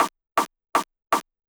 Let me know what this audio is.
Music > Solo percussion
guitar clap
acoustic, clap, techno